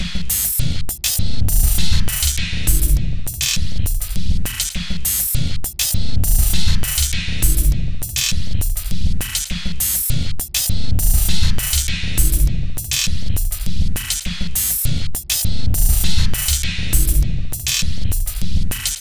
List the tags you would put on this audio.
Instrument samples > Percussion
Underground
Industrial
Alien
Ambient
Loopable
Samples
Dark
Drum
Loop
Weird
Soundtrack
Packs

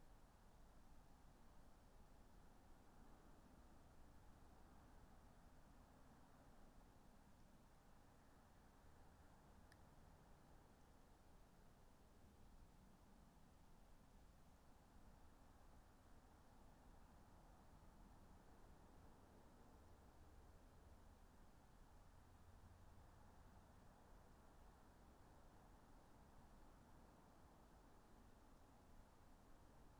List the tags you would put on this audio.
Soundscapes > Nature
Dendrophone
field-recording
modified-soundscape
phenological-recording

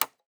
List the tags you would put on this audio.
Sound effects > Human sounds and actions
button
interface
off

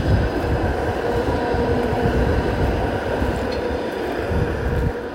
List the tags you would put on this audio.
Soundscapes > Urban
vehicle
tampere

